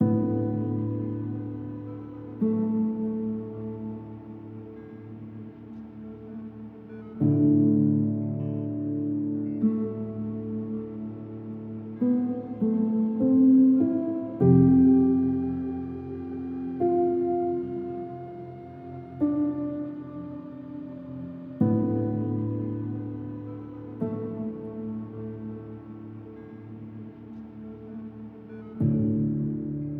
Music > Solo instrument
Calm Ambient Piano Loop
Simple piano loop made in FL Studio
ambient, background, calm, loading, loop, menu, piano, simple